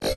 Sound effects > Electronic / Design
RGS-Glitch One Shot 14
Effect, FX, Glitch, Noise